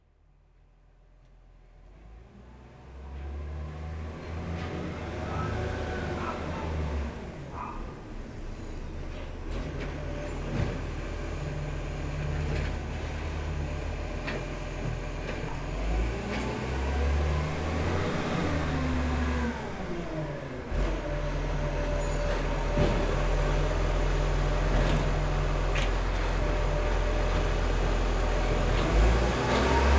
Sound effects > Other mechanisms, engines, machines
Garbage truck approaching and departing from rubbish bin pickup driving and stopping on a suburban road. Recorded with a Zoom H6
garbage, engine, driving, sfx, trash, drive, mechanical, bins